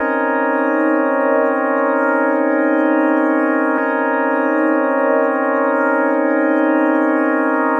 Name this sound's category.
Music > Solo instrument